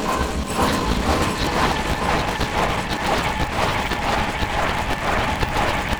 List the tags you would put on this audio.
Sound effects > Electronic / Design
commons industrial industrial-noise industrial-techno noise rhythm sci-fi scifi sound-design techno

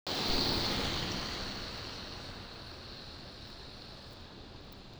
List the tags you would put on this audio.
Sound effects > Vehicles
bus transportation vehicle

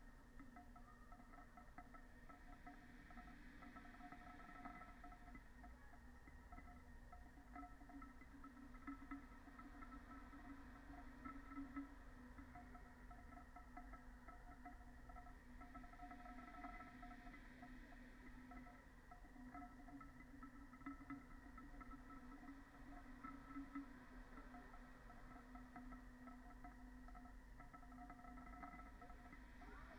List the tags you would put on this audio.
Soundscapes > Nature
natural-soundscape weather-data artistic-intervention sound-installation modified-soundscape alice-holt-forest phenological-recording soundscape field-recording Dendrophone raspberry-pi data-to-sound nature

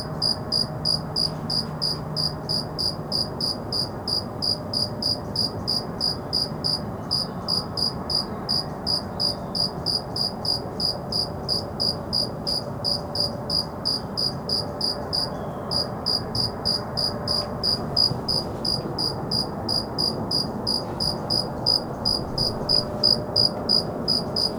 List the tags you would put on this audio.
Sound effects > Animals
outside Cricket Davis

